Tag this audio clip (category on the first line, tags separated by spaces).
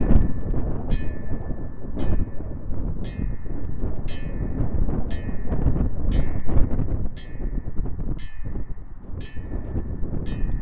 Soundscapes > Nature
wind recording tascam DR05X field